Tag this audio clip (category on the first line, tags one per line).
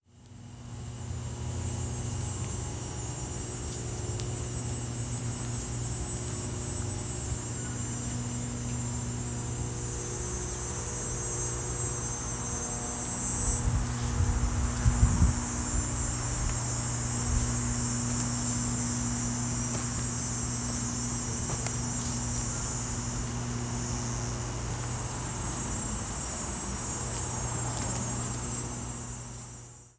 Soundscapes > Other
interlochen
buildings
courtyard
fieldrecording